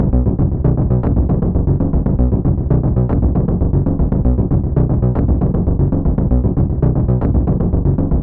Instrument samples > Synths / Electronic
This 233bpm Synth Loop is good for composing Industrial/Electronic/Ambient songs or using as soundtrack to a sci-fi/suspense/horror indie game or short film.
Weird, Ambient, Dark, Packs, Soundtrack, Loopable, Alien, Industrial, Drum, Loop, Samples, Underground